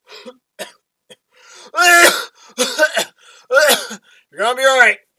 Speech > Solo speech
Dusty in Here. Just a simple cough
Combat, Cough, Battle, Medical, War, Dusty